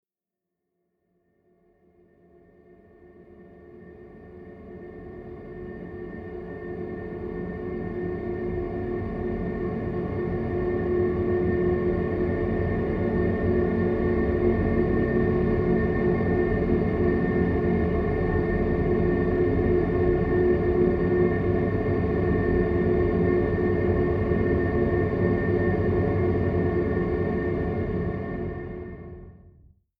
Soundscapes > Synthetic / Artificial
Creepy Tension Background
A chilling horror soundscape with eerie textures and unsettling tension. Perfect for horror films, games, and disturbing atmospheric scenes.
scary, haunted, thriller, eerie, horror, nightmare, soundscape, cinematic, suspense, disturbing, background, dark, tension, creepy, atmosphere